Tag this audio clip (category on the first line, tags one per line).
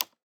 Sound effects > Human sounds and actions
interface
toggle
button
switch
off
click
activation